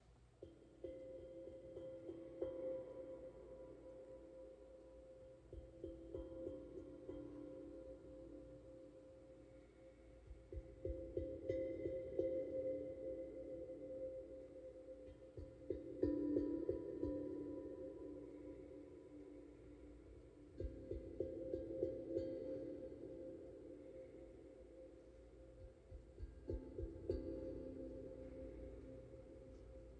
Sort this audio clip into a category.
Music > Solo percussion